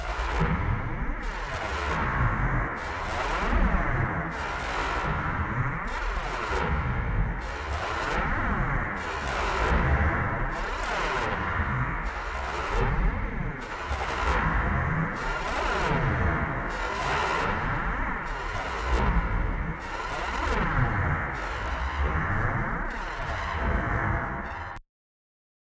Sound effects > Electronic / Design
Data Bolts
The sound of compressed digital energy exploding on impact.
electric, electricity, power, pulse, spark, zap